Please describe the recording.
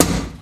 Instrument samples > Percussion
Plastic Metal Bang Bass
A piece of plastic snaps into place on a metal frame. A plastic cover jumping fit and snug where it belongs — a hole on the metal panel flooring of a washer. Recorded with a Canon EOS M50 in a kitchen. Stereo-split, normalized and extracted using Audacity.
base, collision, rumble, bass, bang, rumbly, lo-fi